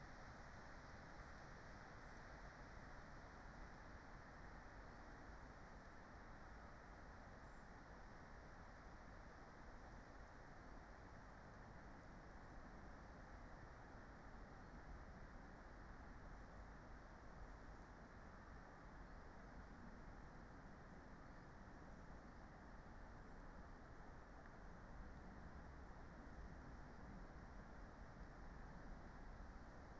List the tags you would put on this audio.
Soundscapes > Nature
raspberry-pi weather-data phenological-recording modified-soundscape soundscape data-to-sound artistic-intervention sound-installation alice-holt-forest nature natural-soundscape Dendrophone field-recording

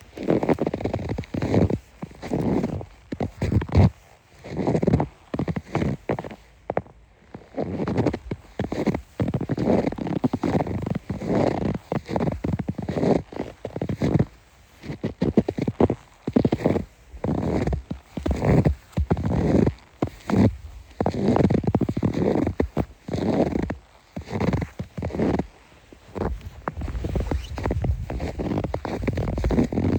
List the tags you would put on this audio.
Sound effects > Human sounds and actions
snow footsteps shoes boots walk walking

